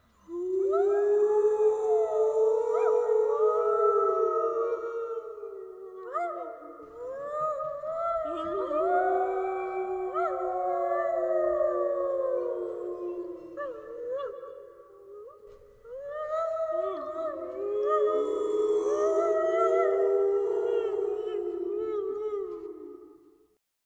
Sound effects > Animals
I voice acted all the wolves at my home studio with Blue Snowball microphone. For God's Glory! Edited with Audacity .